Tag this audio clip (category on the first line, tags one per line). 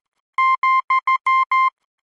Sound effects > Electronic / Design
Morse
Telegragh
Language